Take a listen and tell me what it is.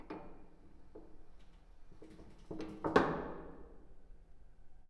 Sound effects > Objects / House appliances
Bomb shelter door locking mechanism 2
In the basement of our apartment building, there is a bomb shelter with heavy metal doors, kind of like submarine doors. This is the sound of its locking mechanism.